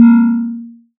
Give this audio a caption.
Instrument samples > Synths / Electronic
CAN 1 Bb
bass, additive-synthesis, fm-synthesis